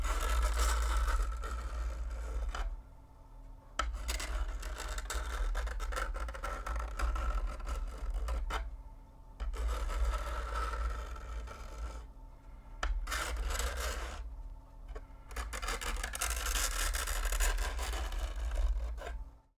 Sound effects > Human sounds and actions
Fingernails on chalkboard.